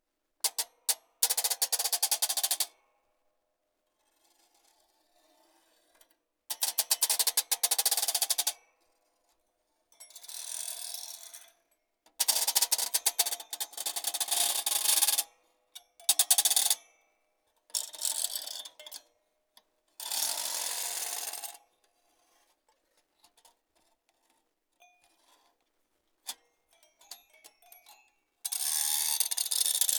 Instrument samples > Percussion
drum Scratch STE-001
drum skrech in the studio recorded in zoom h4n
metal, Scratch